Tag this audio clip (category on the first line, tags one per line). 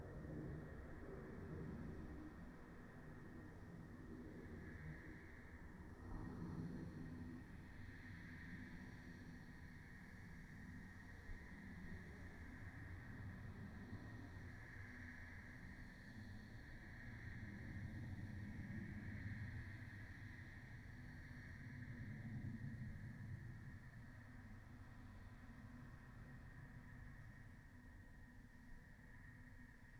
Soundscapes > Nature
alice-holt-forest
field-recording
modified-soundscape
natural-soundscape
nature
raspberry-pi
soundscape